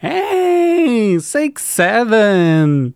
Speech > Solo speech
Six seven 6-7, with some kind of leading interjection vocalization, my voice. Recording: Shure SM7B → Triton FetHead → UR22C → Audacity, compressed
67, awkward, child, cringe, cringey, embarrasing, gen-alpha, gen-z, lame, male, meme, old-meme, seven, shame, six, six-seven, sixseven, speech, stale-meme, stupid, vocal, voice, weird, word, words